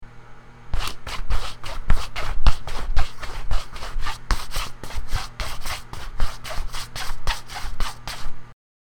Sound effects > Human sounds and actions
Sneakers scuffing across floor. Used my own sneakers
dragging, shoes, shuffling